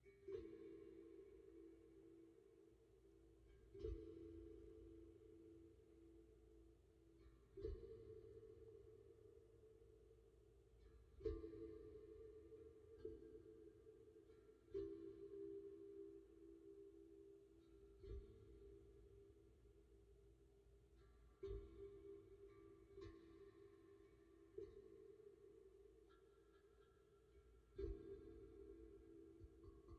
Music > Solo percussion
Seconds Out! Ringing.
Calm, LoFi